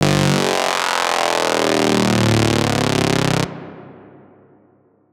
Sound effects > Electronic / Design
sad machine (cyberpunk ambience)
synth, retro, sfx, 80s, ambience, electronic, cyberpunk, synthwave, techno